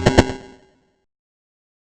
Sound effects > Electronic / Design
Jumpscare (Startling Static)
cinematic-hit, cinematic-stab, cinematic-sting, Dylan-Kelk, horror-hit, horror-impact, horror-sound, horror-stab, horror-sting, jumpscare, jumpscare-noise, jumpscare-sound, jumpscare-sound-effect, Lux-Aeterna-Audio, raw-shock, spooky-sound, startled-noise, startling-sound, startling-sound-effect, sudden-shock, thrilling-shock, thrill-of-fear